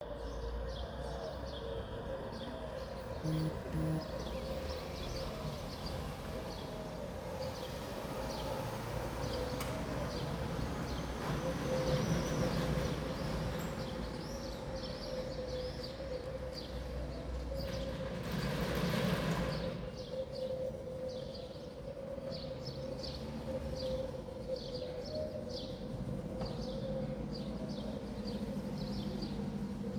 Soundscapes > Urban
Tarragona 14 May 2024 pigeons birds cars
Birds cooing, tweeting in more quiet outdoor ambience. Vehicles are heard in distance and sometimes closer. Recording device: Samsung smartphone.